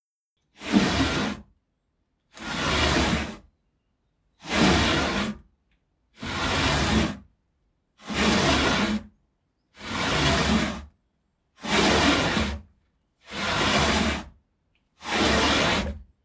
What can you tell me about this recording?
Sound effects > Objects / House appliances
Furniture - Drawers open & close
A wooden drawer that opens and closes. * No background noise. * No reverb nor echo. * Clean sound, close range. Recorded with Iphone or Thomann micro t.bone SC 420.
box, cabinet, close, closing, cupboard, diningroom, door, doors, drag, dragging, drawer, drawers, floor, furniture, gate, livingroom, moving, object, open, opening, pulled, push, pushing, slide, sliding, table, wardrobe, wood, wooden